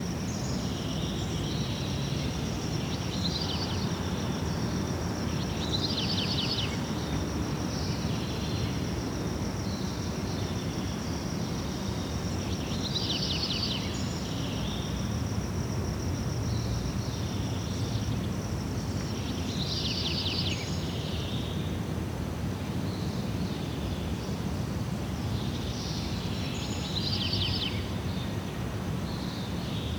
Soundscapes > Urban
Madrugada de verano desde mi ventana con viento, grillos, aves y fondo de ciudad /// Summer's dawn from my window's perspective, with wind, crickets, birds and cityscape
Son las 4am de la madrugada de enero y las aves empiezan a cantar y se escucha el leve bramido del tráfico despertar a lejos en la ciudad. Algunos grillos siguen cantando. 4am in a January morning. Morning dawn of birds can be heard, with distant early traffic, city is waking up. Some crickets are still singing. Zoom F6 + Behringer C2 pair ORTF
park, crickets, traffic